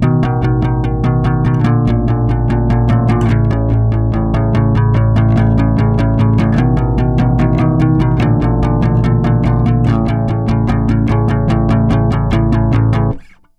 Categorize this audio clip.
Instrument samples > String